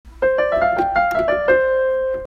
Instrument samples > Piano / Keyboard instruments
Scale of the piano
Keys, Piano